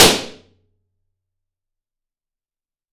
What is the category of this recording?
Soundscapes > Other